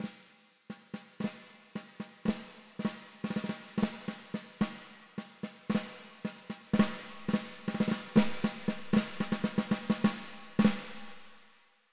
Music > Solo percussion
snare Processed - loose march beat - 14 by 6.5 inch Brass Ludwig
rim, kit, reverb, percussion, roll, snares, drums, flam, acoustic, drumkit, sfx, fx, realdrums, realdrum, rimshot, brass, processed, snareroll, rimshots, hits, crack, oneshot, perc, beat, snare, hit, ludwig, snaredrum, drum